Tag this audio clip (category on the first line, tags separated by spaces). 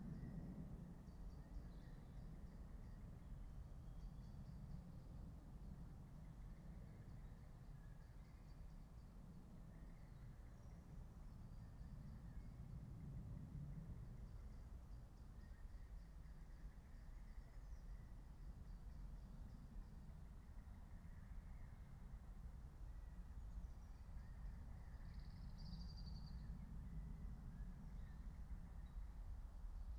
Soundscapes > Nature
nature Dendrophone modified-soundscape natural-soundscape sound-installation soundscape weather-data artistic-intervention alice-holt-forest data-to-sound raspberry-pi phenological-recording field-recording